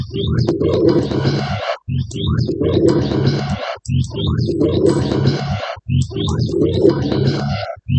Sound effects > Electronic / Design
Stirring The Rhythms 8
dark-design, drowning, sci-fi, scifi, PPG-Wave, sound-design, wonky, weird-rhythm, vst, industrial-rhythm, noise-ambient, science-fiction, content-creator, glitchy-rhythm, dark-techno, noise, rhythm, dark-soundscapes